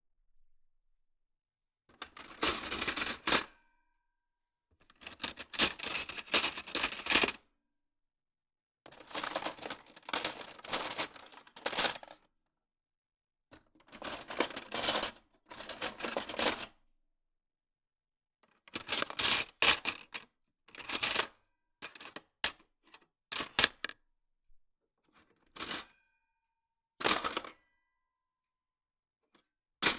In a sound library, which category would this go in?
Sound effects > Objects / House appliances